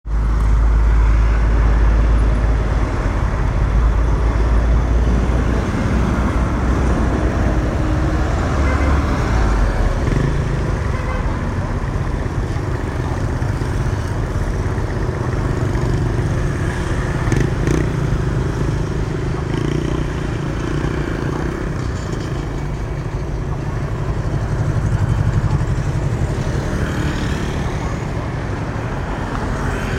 Soundscapes > Urban
Giao Thông Tại Cổng Khu Công Nghiệp Bình Hoà - Trafic Front Of Khu Công Nghiệp
Trafic front of Khu Công Nghiệp Bình Hoà. Record use iPhone Plus 7 Plus smart phone 2025.11.21 14:45
car, motorcycle, road, trafic, trafico, truck